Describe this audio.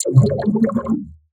Sound effects > Electronic / Design
ROS-FX One Shoot 4.5
Sample used from a drum loop in Flstudio original sample pack. Processed with KHS Filter Table, Vocodex, ZL EQ and Fruity Limiter.
Botanical, One-shot, FX, Rumble